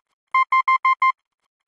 Sound effects > Electronic / Design
A series of beeps that denote the number 5 in Morse code. Created using computerized beeps, a short and long one, in Adobe Audition for the purposes of free use.